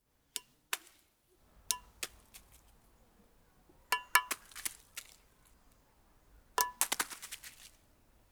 Sound effects > Natural elements and explosions
falling cone on metal
Dropped cone hitting metal barrier then landing on leaves. Location: Poland Time: November 2025 Recorder: Zoom H6 - XYH-6 Mic Capsule
cone, leaves, pine-cone, impact, metal